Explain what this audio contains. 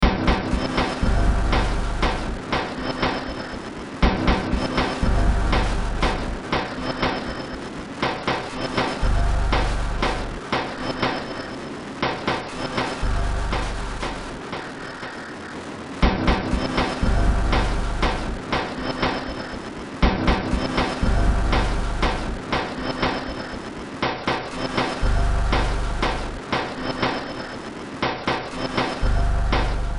Music > Multiple instruments
Demo Track #4015 (Industraumatic)
Ambient, Games, Industrial, Noise, Soundtrack, Underground